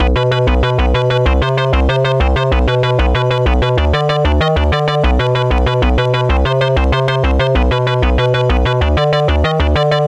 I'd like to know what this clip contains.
Music > Solo instrument
95 D MC202-Monotribe 01
80s, Analog, Loop, Roland